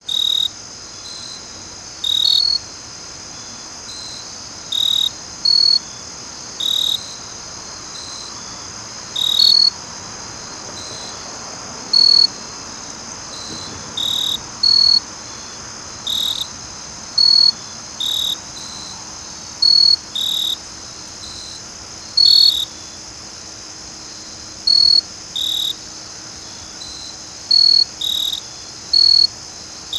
Soundscapes > Nature
Recording of cicadas in shrub near wooded trail beside Earl V. Moore Building at the University of Michigan. Recorded August 30, 2025.